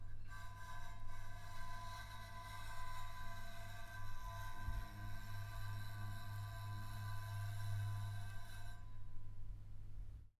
Sound effects > Other
Bowing metal newspaper holder with cello bow 3
atmospheric, bow, eerie, effect, fx, horror, metal, scary